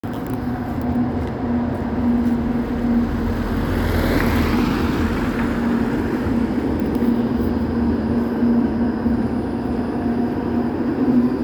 Sound effects > Vehicles
25tram passingintown
There is a tram passing by in the city center of Tampere. There is a car passing by as well, and especially in the beginning some walking steps of a human can be heard in the audio clip as well. Recorded on a samsung phone.